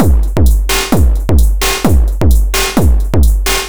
Music > Other
chicken loop 130 bpm
FL studio 24 + microtonic vst
electronic, idm